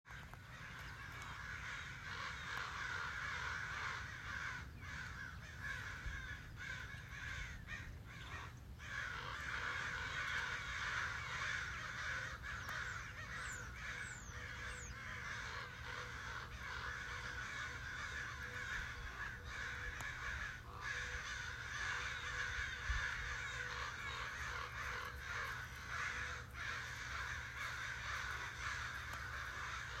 Soundscapes > Nature
Murder of crows 09/10/2024
Murder of crows
fall, birds, birdsong, bird, field-recording, rural, nature